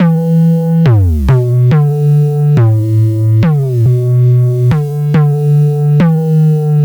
Music > Solo instrument
OG Grime Synth Loop
Old School sounding loop inspired by Wiley's Eskimo sound.
140, 140bpm, 4, 4bars, bpm, Eski, Eskimo, Grime, loop